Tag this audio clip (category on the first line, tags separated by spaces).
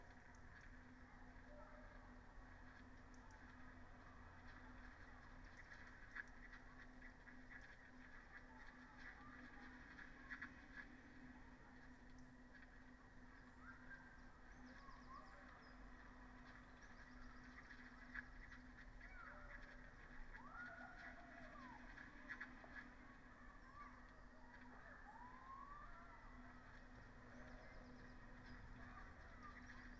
Soundscapes > Nature
alice-holt-forest weather-data natural-soundscape artistic-intervention modified-soundscape Dendrophone data-to-sound soundscape raspberry-pi sound-installation nature field-recording phenological-recording